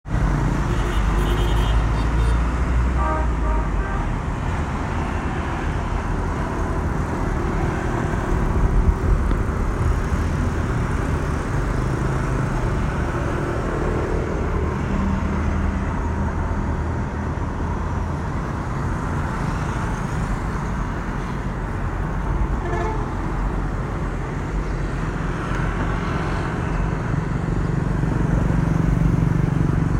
Soundscapes > Urban
Giao Thông Trước Cổng Khu Công Nhiêp Bình Hòa - Trafic sound - 2026.01.11 08:19
Trafic sound front of Khu Công Nghiệp Bình Hòa. Record use iPhone 7 Plus smart phone 2026.01.11 08:19.